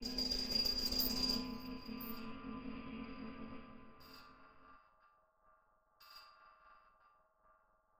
Sound effects > Electronic / Design
I finally sat down to explore Native Instruments Absynth sampler feature. I used samples from my, 'Broken Freezer Sample Pack' samples to make these noises. It is a low effort beginner pack. It is for documentation purposes but maybe you can find it useful.